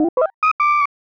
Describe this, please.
Sound effects > Other mechanisms, engines, machines
Small Robot - Angry 1
An angry sounding small robot talk, bleeping. I originally designed this for some project that has now been canceled. Designed using Vital synth and Reaper